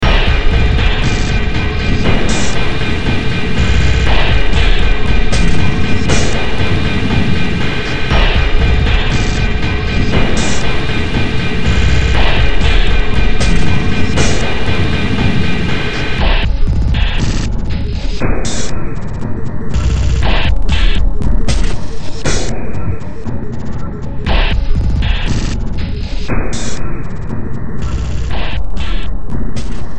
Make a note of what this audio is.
Music > Multiple instruments
Demo Track #3892 (Industraumatic)
Soundtrack, Noise, Cyberpunk, Underground, Sci-fi